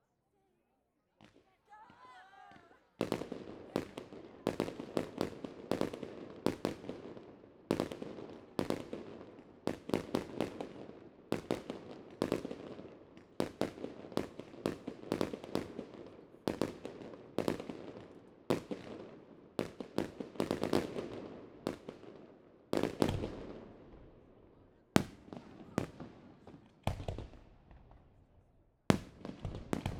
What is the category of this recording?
Soundscapes > Urban